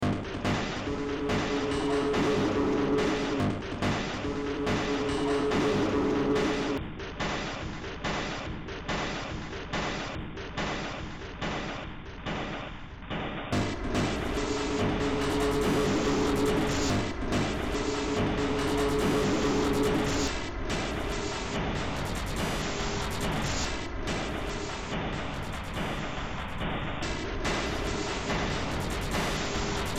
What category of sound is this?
Music > Multiple instruments